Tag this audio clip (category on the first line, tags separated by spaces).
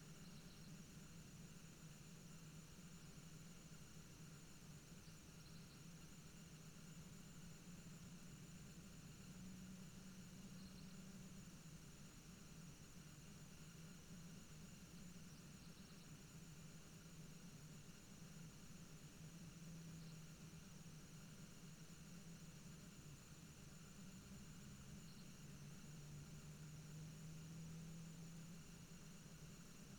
Soundscapes > Nature
nature modified-soundscape raspberry-pi natural-soundscape phenological-recording artistic-intervention sound-installation Dendrophone alice-holt-forest weather-data soundscape field-recording data-to-sound